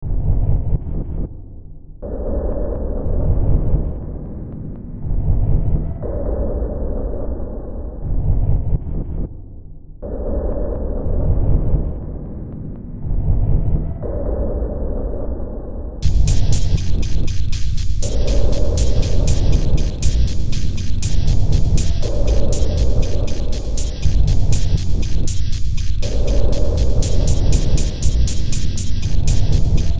Music > Multiple instruments
Demo Track #3398 (Industraumatic)
Games, Underground, Sci-fi, Horror, Industrial, Ambient, Soundtrack, Noise, Cyberpunk